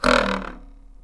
Sound effects > Other mechanisms, engines, machines
Handsaw Beam Plank Vibration Metal Foley 11
plank, metal, household, perc, handsaw, vibration, foley, vibe, hit, tool, percussion, metallic, twangy, smack, shop, fx, sfx, twang, saw